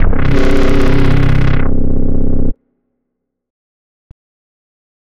Instrument samples > Synths / Electronic
Deep Pads and Ambient Tones21
Pad, bassy, Tones, synthetic, Synthesizer, bass, Tone, Ominous, Note, Dark, Chill, Analog, Haunting, Digital, Pads, Oneshot, Deep, Synth, Ambient